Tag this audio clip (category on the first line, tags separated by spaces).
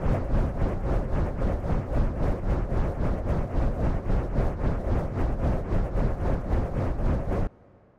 Sound effects > Electronic / Design
Airy Spin digital Loop Whoosh synth